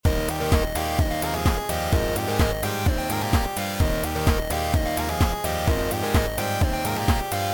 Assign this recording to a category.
Music > Multiple instruments